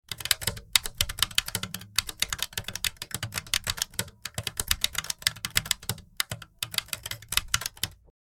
Sound effects > Electronic / Design
keyboard sound effects smooth.
keyborad, type, typing, computer, realvoice, typewriter